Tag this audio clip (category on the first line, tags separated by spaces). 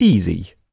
Speech > Solo speech
Neumann word Mid-20s singletake Tascam voice Single-take oneshot Vocal NPC smug FR-AV2 U67 Voice-acting cocky talk sarcastic Human Video-game Man Male